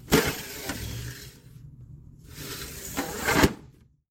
Sound effects > Objects / House appliances
FOLYProp-Samsung Galaxy Smartphone, CU Dollhouse, Plastic, Open, Close Nicholas Judy TDC
A plastic dollhouse opening and closing.